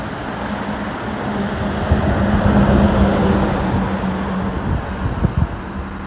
Soundscapes > Urban
City bus engine and passenger transport vehicle. Low-frequency diesel engine rumble. Air-brake hiss during stopping, subtle vibrations from the chassis, tire noise rolling over asphalt. Occasional mechanical rattling and distant urban ambience such as traffic and wind. Recorded on iPhone 15 in Tampere. Recorded on iPhone 15 outdoors at a city bus stop on a busy urban street. Used for study project purposes.
bus, transportation, vehicle